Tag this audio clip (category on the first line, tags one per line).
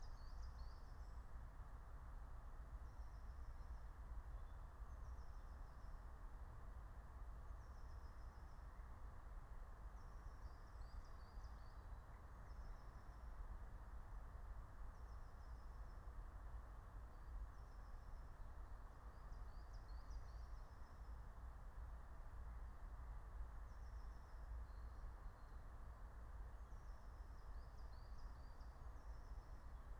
Soundscapes > Nature
nature
field-recording
alice-holt-forest
raspberry-pi
meadow
natural-soundscape
soundscape
phenological-recording